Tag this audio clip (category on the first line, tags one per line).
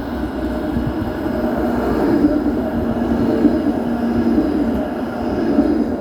Sound effects > Vehicles
moderate-speed
passing-by
tram
embedded-track
Tampere